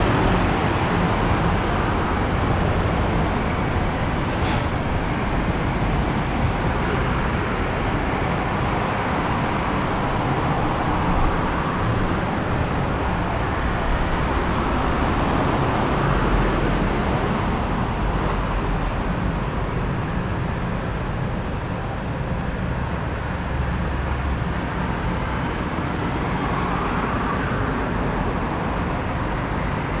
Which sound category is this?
Soundscapes > Urban